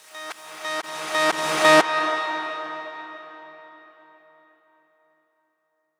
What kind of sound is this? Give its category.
Sound effects > Electronic / Design